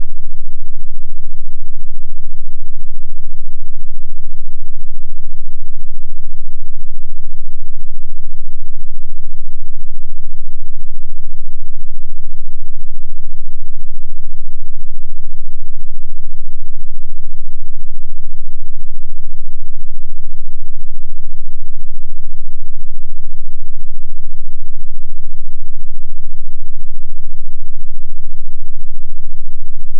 Sound effects > Experimental
French Flag
Warning LOUD! Using the waveform preview and frequencies to draw in it. Here you have 7hz for blue. Transparent for white if you have light theme on. 20khz for red. Due to the low frequency, of the blue, I had to make a long sound file so that the oscillations were masked. On a 3s file, you could see them drawn and it didn't make a blue paint, just a blue wave-form.
preview, wave-form, Flag, Freesound20, French, France